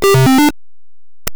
Sound effects > Electronic / Design
sound made in.... openmpt!!! it sucks. its also very clicky and crusty cuz... idk. post processed it to be so, idk why.